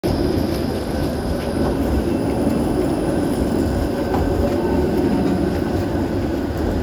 Sound effects > Vehicles
05.tram incurve town
In the city centre of a mid-sized Finnish city, a tram is driving in a curve. The driving speed is relatively slow, and the sound sample consists of the tram sound. Recorded on a Samsung Galaxy phone.
moving, tram, turn